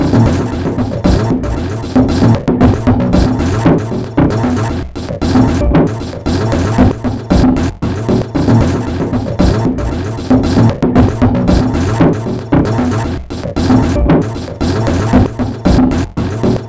Instrument samples > Percussion
This 115bpm Drum Loop is good for composing Industrial/Electronic/Ambient songs or using as soundtrack to a sci-fi/suspense/horror indie game or short film.
Underground, Alien, Packs, Weird, Samples, Ambient, Loop, Drum, Soundtrack, Dark, Industrial, Loopable